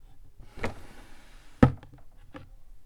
Sound effects > Objects / House appliances
Wooden Drawer 10
drawer, open, wooden